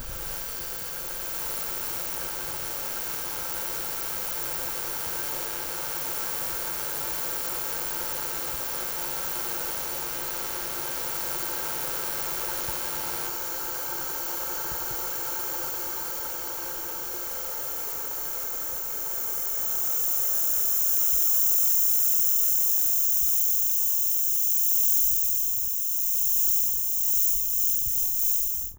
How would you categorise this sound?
Sound effects > Other